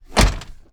Sound effects > Vehicles
Ford 115 T350 - Door closing
Subject : Recording a Ford Transit 115 T350 from 2003, a Diesel model. Date YMD : 2025 August 08 Around 19h30 Location : Albi 81000 Tarn Occitanie France. Weather : Sunny, hot and a bit windy Processing : Trimmed and normalised in Audacity. Notes : Thanks to OMAT for helping me to record and their time.
115, 2003, 2003-model, 2025, A2WS, August, Ford, Ford-Transit, France, FR-AV2, Mono, Old, Single-mic-mono, SM57, T350, Tascam, Van, Vehicle